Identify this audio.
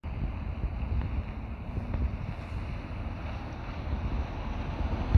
Soundscapes > Urban
An electric bus passing the recorder in a roundabout. The sound of the bus tires can be heard in the recording. Recorded on a Samsung Galaxy A54 5G. The recording was made during a windy and rainy afternoon in Tampere.